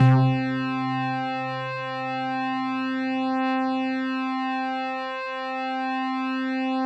Instrument samples > Synths / Electronic
A Layered Synth and Bass Sound
1, 808, Bass, FL, Grime, Jungle, Layer, Layered, One, Shot, Synth, Trap, Xpand